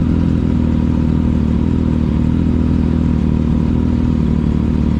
Sound effects > Other mechanisms, engines, machines
clip prätkä (10)
Description (Motorcycle) "Motorcycle Idling: distinctive clicking of desmodromic valves, moving pistons, rhythmic thumping exhaust. High-detail engine textures recorded from close proximity. Captured with a GoPro Hero 4 on the track at Alastaro. The motorcycle recorded was a Ducati Supersport 2019."